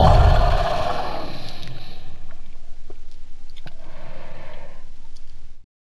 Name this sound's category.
Sound effects > Experimental